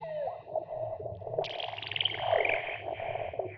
Soundscapes > Synthetic / Artificial
LFO Birdsong 65
massive, Birdsong, LFO